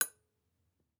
Sound effects > Other mechanisms, engines, machines
High Tap 01
sample, noise